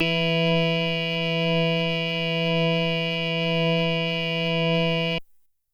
Instrument samples > Synths / Electronic
Synth organ patch created on a Kawai GMega synthesizer. E5 (MIDI 76)
digital, strange, gmega, synth, bass, dry, patch, melodic, synthesizer, kawai